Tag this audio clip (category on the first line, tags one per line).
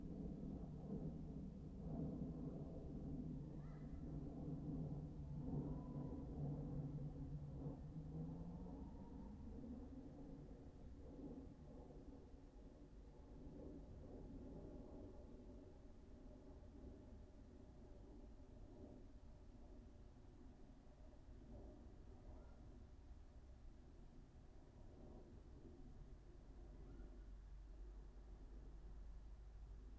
Soundscapes > Nature
alice-holt-forest
field-recording
nature
phenological-recording
raspberry-pi
natural-soundscape
soundscape
meadow